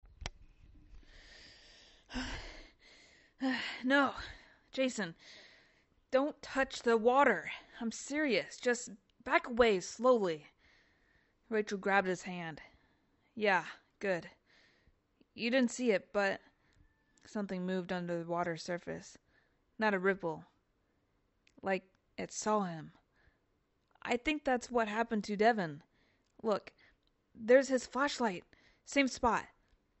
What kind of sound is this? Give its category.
Speech > Solo speech